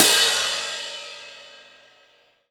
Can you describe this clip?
Instrument samples > Percussion
crash XWR 6
Old crashfiles low-pitched, merged and shrunk in length.
Paiste, smash, spock